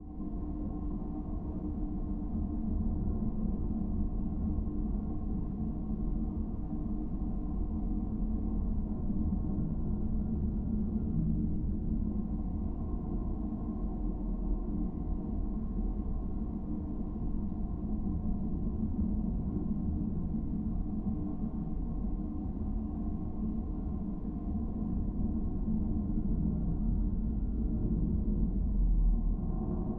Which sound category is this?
Soundscapes > Synthetic / Artificial